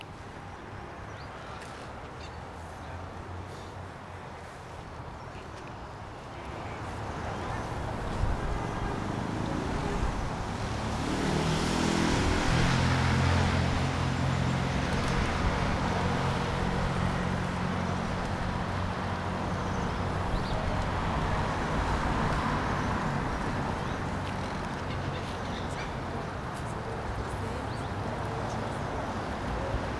Soundscapes > Urban
20250312 UpperPartJardinsMontbau traffic animals workers lessPleasant noisy
animals, Montbau, noisy